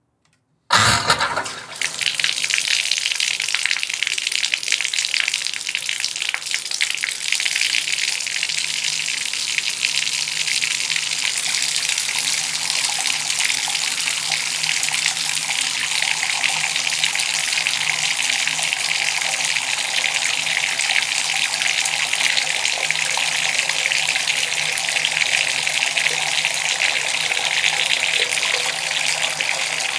Sound effects > Objects / House appliances
Occasionally the water in my building needs to be turned off for maintenance and when it comes back on, sometimes there is a lot of air in the pipes which makes a wild noise. Unfortunately this time it was not very dramatic. This is the sound of turning off my bathtub faucet and letting it run for a few moments then turning it off and the water goes down the drain and gurgles at the end.
bath, bathtub, drain, faucet, gurgle, splash, tub, water, wet